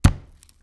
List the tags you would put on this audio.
Sound effects > Experimental
bones foley onion punch thud vegetable

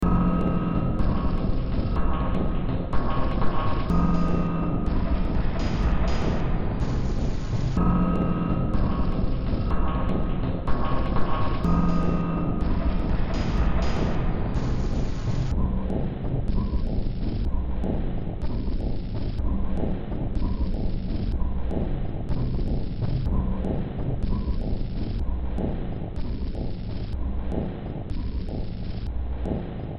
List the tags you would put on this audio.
Music > Multiple instruments
Soundtrack Industrial Ambient Sci-fi Noise Horror Underground Games Cyberpunk

